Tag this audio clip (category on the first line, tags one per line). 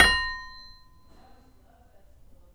Sound effects > Other mechanisms, engines, machines
tools
wood
sound
shop
metal
knock
tink
bam
crackle
bang
pop
oneshot
perc
fx
little
percussion
rustle
foley
bop
sfx
boom
strike
thud